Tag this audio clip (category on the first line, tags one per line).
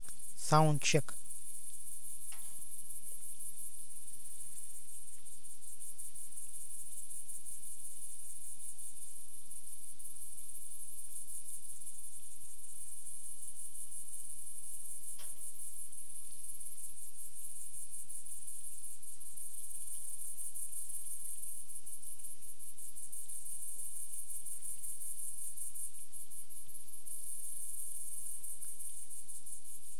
Soundscapes > Nature
night
Song
Nature
quiet
singing
lake